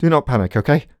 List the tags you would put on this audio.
Speech > Solo speech
Vocal
Man
dialogue
voice
NPC
FR-AV2
Single-take
Human
Voice-acting
U67
Mid-20s
Video-game
Fear
singletake
Male
talk
sentence
oneshot
Neumann
Tascam

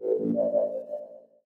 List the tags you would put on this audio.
Sound effects > Electronic / Design
alert
confirmation
digital
interface
message
selection

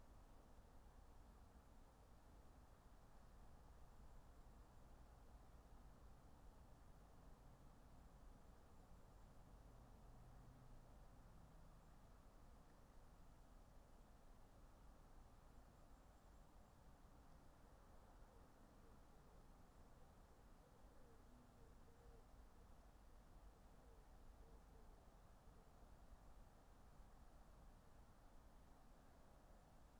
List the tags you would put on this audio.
Soundscapes > Nature
data-to-sound
modified-soundscape
field-recording
raspberry-pi
nature
natural-soundscape
phenological-recording
weather-data
artistic-intervention
alice-holt-forest
Dendrophone
soundscape
sound-installation

